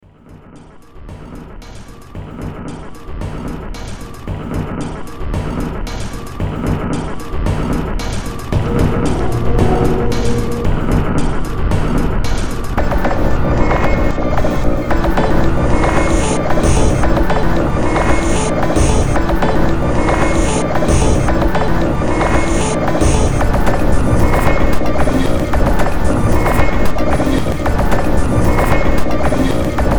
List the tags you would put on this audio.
Music > Multiple instruments
Horror
Underground

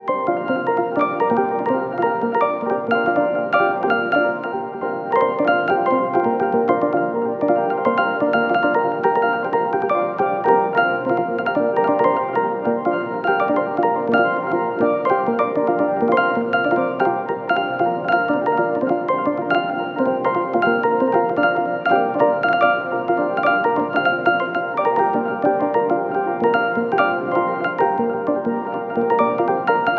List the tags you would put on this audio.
Soundscapes > Synthetic / Artificial
Granular
Ambient
Beautiful
Atomosphere
Botanica

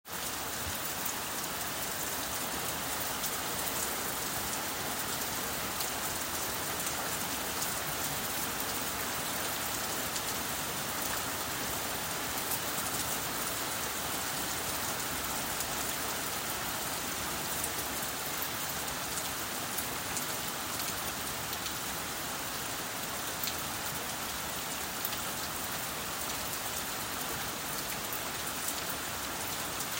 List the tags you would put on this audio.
Soundscapes > Nature
afternoon
backwoods
downpour
field-recording
forest
jungle
log-cabin
nature
rain
rainstorm
Scandinavia
spring
summer
Sweden
thunder
torrent
wilderness
woodlands

